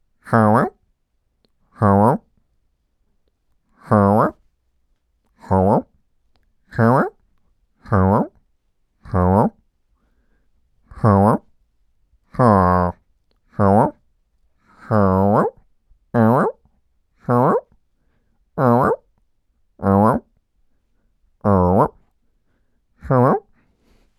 Speech > Solo speech
Subject : A mid20s male voice-acting for the first time. Check out the pack for more sounds. Doing some "villager" type accent. Weather : Processing : Trimmed and Normalized in Audacity, Faded in/out. Notes : I think there’s a “gate” like effect, which comes directly from the microphone. Things seem to “pop” in. Also sorry my voice-acting isn’t top notch, I’m a little monotone but hey, better than nothing. I’l try to do better and more pushed acting next time ;) Tips : Check out the pack!